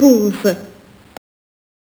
Speech > Solo speech
Boing in Italian ("punf")
My kid saying "punf", which in Italian describes the sound of something falling on the floor ..probably like a "boing" in English :) Recorded with a Tascam Portacapture X6
kid, punf, boing, voice, italian